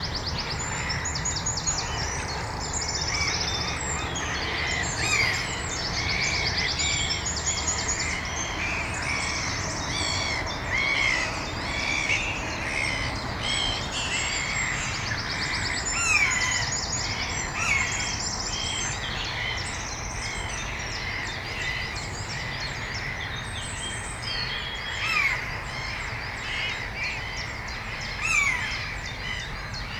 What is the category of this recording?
Soundscapes > Nature